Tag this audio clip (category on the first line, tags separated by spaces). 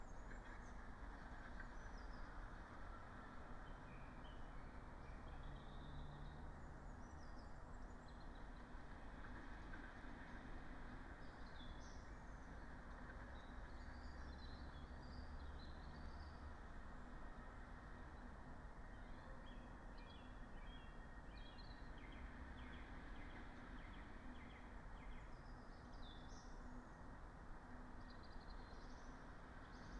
Soundscapes > Nature
nature sound-installation raspberry-pi weather-data alice-holt-forest soundscape Dendrophone natural-soundscape phenological-recording artistic-intervention modified-soundscape data-to-sound field-recording